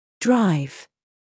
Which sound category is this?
Speech > Solo speech